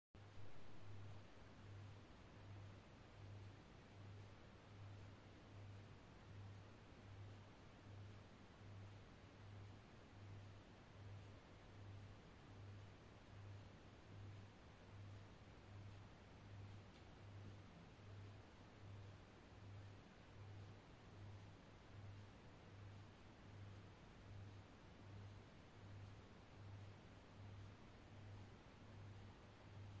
Sound effects > Objects / House appliances
Ceiling fan in silent room
Sound of my ceiling fan
Air-conditioner, Fan